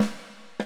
Music > Solo percussion
Snare Processed - Oneshot 102 - 14 by 6.5 inch Brass Ludwig
drum, drums, ludwig, oneshot, perc, processed, realdrum, realdrums, snares